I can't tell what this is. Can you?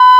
Sound effects > Electronic / Design
1005hz Harmonic Censor beep
Another censor beep. Once again avoiding a traditional 1000hz sinewave and trying to make something original. This time a 1005hz base with harmonic frequencies (1507.5 2010 4020hz) of different wave shapes. I also applied a very light distortion on the 1005hz sinewave, flattening it a bit. Fade in/out. This could be used to distinguish different characters being censored/blipped all while conveying the familiarity of the traditional blip.